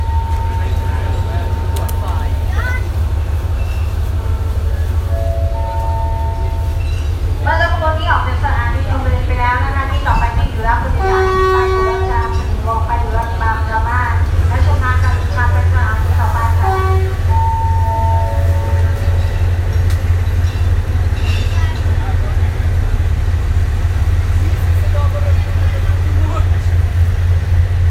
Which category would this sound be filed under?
Soundscapes > Other